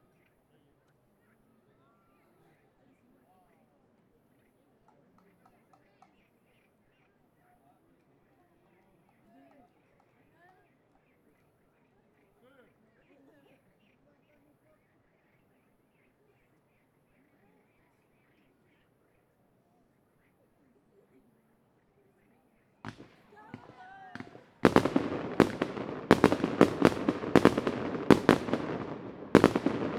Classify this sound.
Soundscapes > Urban